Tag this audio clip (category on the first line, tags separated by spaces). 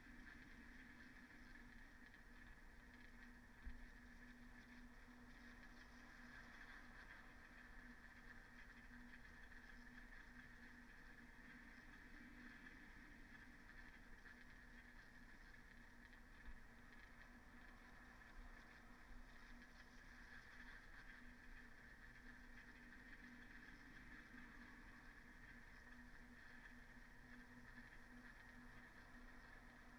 Soundscapes > Nature
soundscape phenological-recording modified-soundscape field-recording alice-holt-forest data-to-sound natural-soundscape nature Dendrophone artistic-intervention weather-data sound-installation raspberry-pi